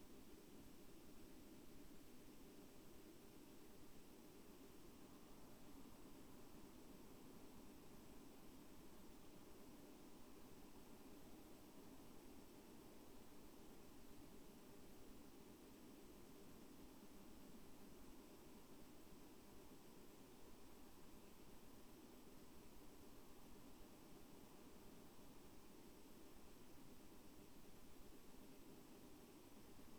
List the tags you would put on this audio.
Soundscapes > Nature
alice-holt-forest data-to-sound Dendrophone field-recording modified-soundscape natural-soundscape nature phenological-recording raspberry-pi sound-installation soundscape weather-data

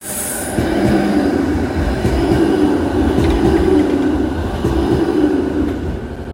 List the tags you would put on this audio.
Sound effects > Vehicles
tampere tram

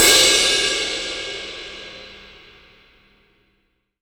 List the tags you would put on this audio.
Instrument samples > Percussion
Crash Cymbal Hit

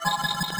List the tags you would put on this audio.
Sound effects > Electronic / Design
message confirmation interface alert selection digital

